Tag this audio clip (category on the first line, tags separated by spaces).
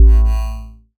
Instrument samples > Synths / Electronic
fm-synthesis additive-synthesis bass